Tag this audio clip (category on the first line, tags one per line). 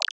Instrument samples > Percussion
Botanical
EDM
Organic
Snap